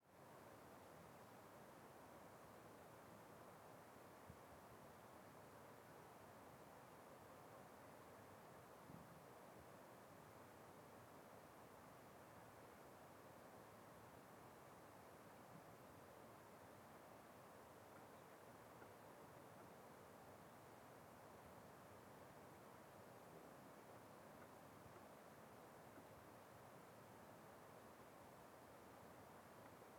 Soundscapes > Nature
soundscape recorded with zoom h6